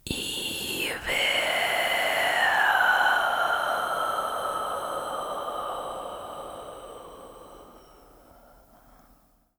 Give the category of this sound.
Speech > Other